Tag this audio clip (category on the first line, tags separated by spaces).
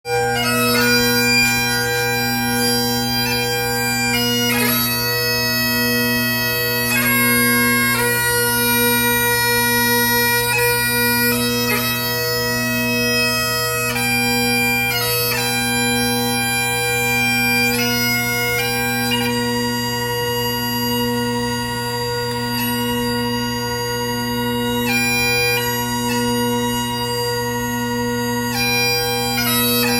Music > Solo instrument
ceremonial bagpipes field-recording bagpipe yukon whitehorse